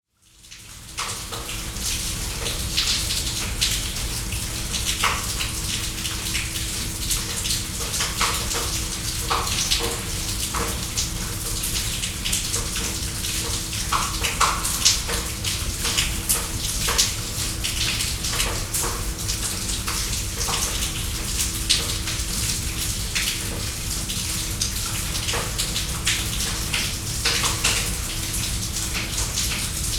Soundscapes > Urban
Rain Gutter Parking Garage Part 2
A second recording of a flowing rain gutter in a parking garage. Equipment: Pair Clippy Omni Mics Zoom F3 field recorder
parkinggarage, dripping, weather